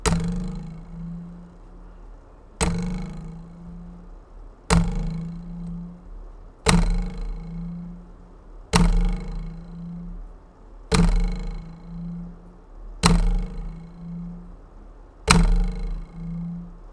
Sound effects > Objects / House appliances
TOONTwang-Blue Snowball Microphone, CU Ruler, Glass, Resonant Nicholas Judy TDC
Glass ruler twangs with resonance.
ruler, twang, Blue-Snowball